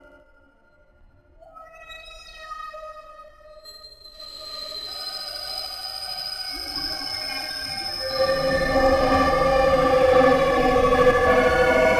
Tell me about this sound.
Sound effects > Electronic / Design
Murky Drowning 11
cinematic, content-creator, dark-design, dark-soundscapes, dark-techno, drowning, horror, mystery, noise, noise-ambient, PPG-Wave, science-fiction, sci-fi, scifi, sound-design, vst